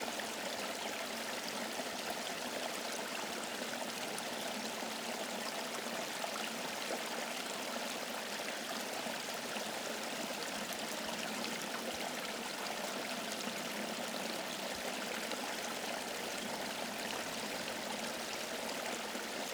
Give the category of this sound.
Sound effects > Natural elements and explosions